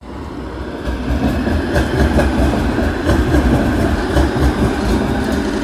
Sound effects > Vehicles
A tram passing by from distance on Insinöörinkatu 23 road, Hervanta aera. Recorded in November's afternoon with iphone 15 pro max. Road is dry.
sunny, tampere, tram